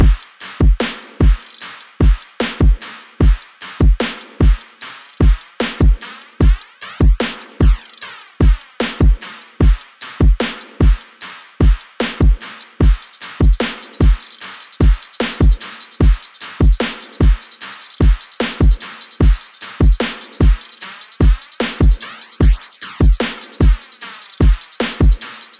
Instrument samples > Percussion
FILTH drum loop 2 150BPM
drum, edm, loop